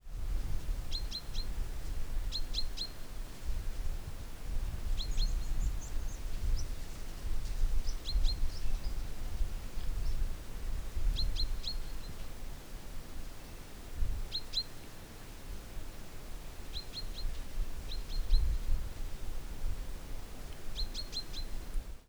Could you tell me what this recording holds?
Soundscapes > Nature
Daytime atmospheric field recording of various distant bird calls.